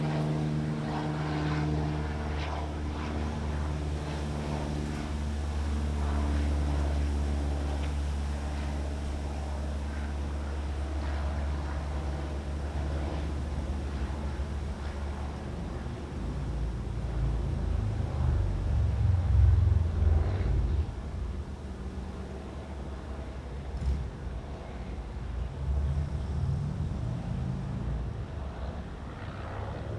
Soundscapes > Urban
Planes and helicopters above Philadelphia
This is a stereo recording taken from the back patio of my home in South Philadelphia on 9/4/25 a few hours before the first game of the Eagle's season vs. the Cowboys. Several planes and helicopters have been overhead all afternoon. Sounds like a warzone, or a party. You decide.
backyard, breeze, chaos, city, plane, war